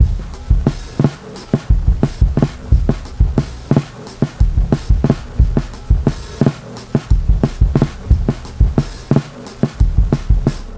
Music > Solo percussion
bb drum break loop fire2 89
89BPM, Acoustic, Break, Breakbeat, Drum, DrumLoop, Drums, Drum-Set, Dusty, Lo-Fi, Vintage, Vinyl